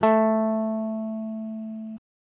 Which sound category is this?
Instrument samples > String